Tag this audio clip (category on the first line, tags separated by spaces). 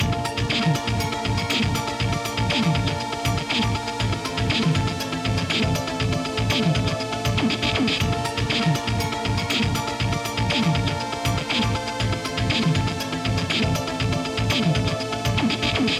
Music > Multiple instruments
dreamy lo-fi